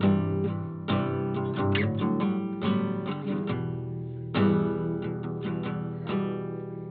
Music > Solo instrument
Lofi Acoustic Guitar 139bpm
I record this guitar sample with the Mic Boya By-bm6060 and then I drop in to Ableton then I use Cymatics Origin and EQ8 This sample can be a loop of 139bpm 🔥This sample is free🔥👽 If you enjoy my work, consider showing your support by grabbing me a coffee (or two)!
acoustic, guitar, lo-fi, lofi, nylon-guitar, tape, vintage